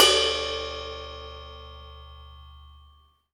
Music > Solo instrument
Cymbal Muted-005
Ride, Perc, Cymbals, Percussion, Hat, Paiste, Cymbal, GONG, Metal, FX